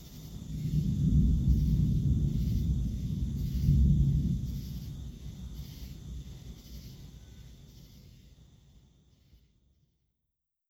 Sound effects > Natural elements and explosions
THUN-Samsung Galaxy Smartphone, MCU Thunder, Rumble, Short, Bugs Nicholas Judy TDC
A short thunder rumble with bugs.
bugs, Phone-recording, rumble, short, thunder